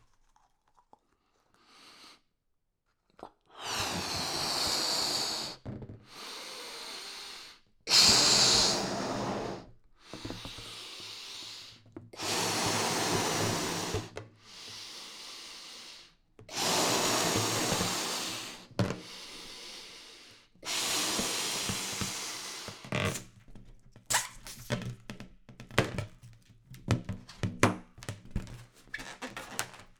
Sound effects > Natural elements and explosions
Inflating balloon and tying knot 1
Subject : A dude Inflating a balloon. Then tying a knot (over two fingers, pinch it, pass the band over) Date YMD : 2025 July 25 Location : Indoors. Rode NT5 with a NT45-o Omni capsule. Weather : Processing : Trimmed and normalised in Audacity.
ballon, Balloon, baloon, blowing, FR-AV2, human, indoor, inflate, Inflating, knot, male, mouth, NT45-o, NT5-o, NT5o, omni, omni-mic, Rode, rubber, Tascam, tying, unprocessed